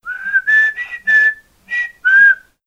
Sound effects > Human sounds and actions
WHSTHmn-Blue Snowball Microphone, CU Comical End, Tune Nicholas Judy TDC
A human whistling a comical ending tune.
Blue-Snowball, Blue-brand, end, human, whistle, tune, comical